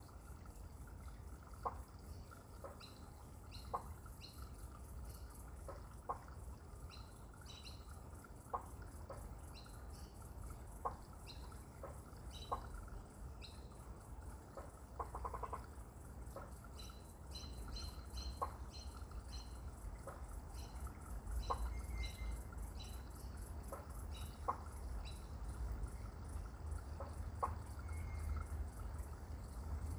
Soundscapes > Nature
A wet and froggy creek loop! With added serenade from the bugs. Not sure which specific one is making the sound, but I could see dragonflies everywhere. Recorded in summer in Victoria, Australia. Looped with REAPER
ambiance, Australia, bugs, creek, dragonfly, field-recording, frog, insects, loop, nature, summer